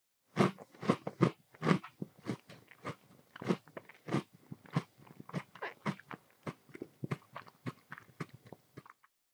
Sound effects > Other
FOODEat Cinematis RandomFoleyVol2 CrunchyBites MacadamiaNutsBite ClosedMouth SlowChew Freebie
This is one of the several freebie items of my Random Foley | Vol.2 | Crunchy Bites pack.
bag, bite, bites, crunch, crunchy, design, effects, foley, food, handling, macadamia, nuts, plastic, postproduction, recording, rustle, SFX, snack, sound, texture